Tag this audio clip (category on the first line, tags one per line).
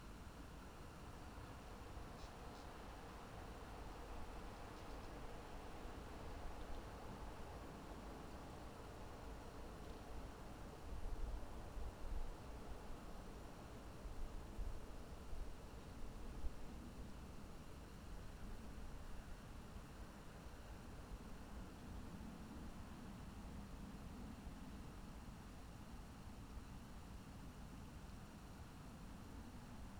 Soundscapes > Nature
phenological-recording
natural-soundscape
nature
meadow
raspberry-pi
alice-holt-forest
field-recording
soundscape